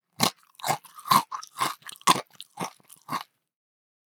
Sound effects > Other
FOODEat Cinematis RandomFoleyVol2 CrunchyBites CrackerBite OpenMouth NormalChew 01 Freebie
rustle, cracker, recording, foley, bites, effects, crunch, bite, handling, plastic, snack, sound, food, SFX, texture, postproduction, bag, crunchy